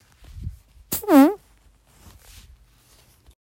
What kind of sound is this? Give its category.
Sound effects > Other